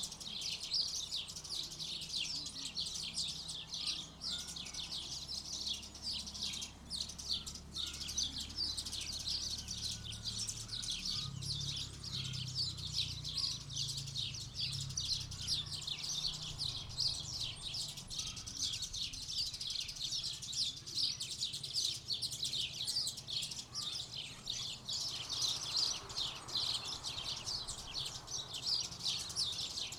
Soundscapes > Nature
sparrow bush in Tunisia coutryside
Sparrows in a bush in Tunisian Countryside.
sparrow, Tunisie, school, birds, countryside, Tunisia, El-Kef, school-yard, field-recording